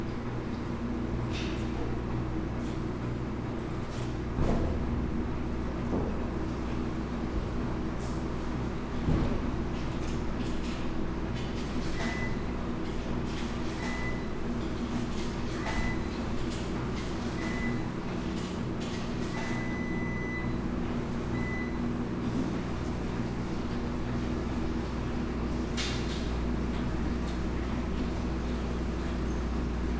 Sound effects > Objects / House appliances
Recording of the local laundromat as people wash clothes and dry them in washers and dryers. some scuffels, rummaging through clothes , vents, ticking, beeping, machines, and distant bangs and thuds. Money machine dispensing change in background. Recorded with Tascam DR-05 field recorder and processed lightly with Reaper.